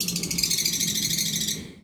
Sound effects > Animals
Bird Chatting Smack
birb, bird, bird-chirp, bird-chirping, birdie, call, calling, chatter, chattering, chirp, chirping, giggle, giggling, indonesia, isolated, little-bird, short, single